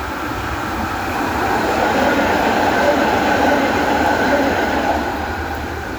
Soundscapes > Urban
A Tram driving by at high speed in Hervanta/Hallila, Tampere. Some car traffic or wind may be heard in the background. The sound was recorded using a Samsung Galaxy A25 phone